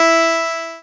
Instrument samples > Synths / Electronic
[BrazilFunk] Lead One-shot 2-E Key
Synthed with phaseplant only. Processed with Fruity Limiter, Waveshaper and ZL EQ. To use it better, just do these below: 1. Put it into FLstudio sampler and stretch mode select ''Stretch''. 2. Click the wrench iconic, right click the piano E5 key. 3. Pogo amount use left -2% or do nothing.
One-shot, BrazilFunk, Lead, BrazilianFunk